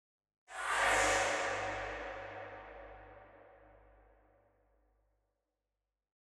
Sound effects > Electronic / Design
Dx7 GROUND NOISE WHOOSH
air, flyby, gaussian, jet, pass-by, Sound, swoosh, synth, transition, ui, whip, whoosh